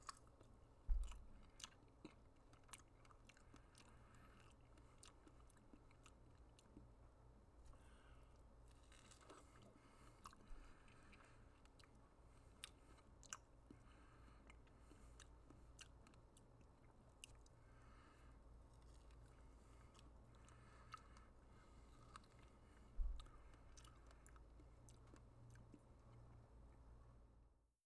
Sound effects > Human sounds and actions
FOODEat-Blue Snowball Microphone Strawberries Nicholas Judy TDC
Someone eating strawberries.
Blue-brand, Blue-Snowball, eat, foley, human, strawberry